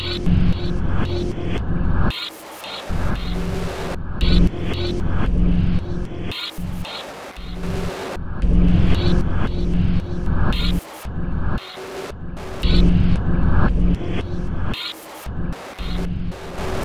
Instrument samples > Percussion
This 114bpm Drum Loop is good for composing Industrial/Electronic/Ambient songs or using as soundtrack to a sci-fi/suspense/horror indie game or short film.
Ambient, Drum, Dark, Loopable, Packs